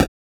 Instrument samples > Percussion
8 bit-Noise Percussion4
8-bit, FX, game, percussion